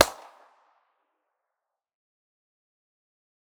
Sound effects > Other
The impulse response of the street acoustics of a residential area. I recorded it standing between a long five-story residential building (facing it) and the school stadium (behind me). There was a wide lawn strip with a sidewalk between them. A characteristic echo with a short tail is heard. All the impulses have been thoroughly post-processed. It can be used in convolution reverbs. Recorded on Tascam DR-05x, with post processing. I ask you, if possible, to help this wonderful site stay afloat and develop further. Enjoy it! I hope that my sounds and phonograms will be useful in your creativity. Note: audio quality is always better when downloaded.